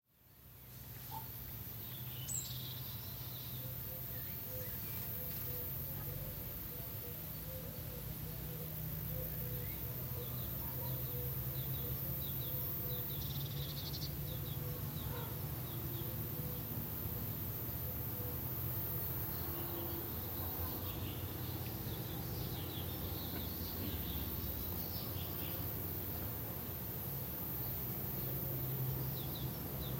Soundscapes > Nature
Corfu - Quiet Morning, Corfu Village
village, rural, corfu, calm